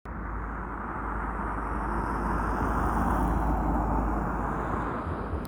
Sound effects > Vehicles
A car passing by in Hervanta, Tampere. Recorded with Samsung phone.